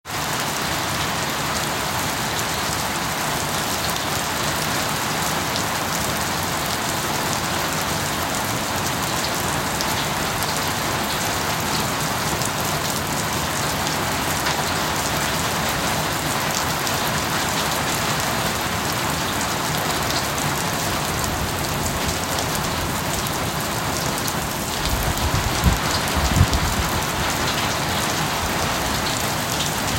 Soundscapes > Nature
Heavy heavy rain 12/27/2022
Heavy heavy rain
downpour, field-recordings, heavy-rain, raindrop, water